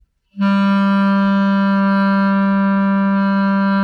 Instrument samples > Wind
Recorded using microphone from phone.
Clarinet, Sustained, Wind